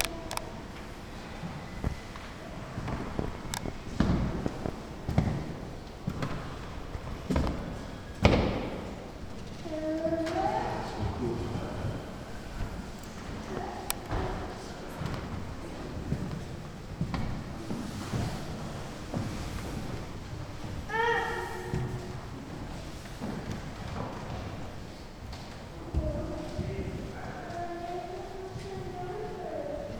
Soundscapes > Urban
Berlin - museum walk
I recorded this while visiting Berlin in 2022 on a Zoom field recorder. This was recorded while walking in a museum in Berlin.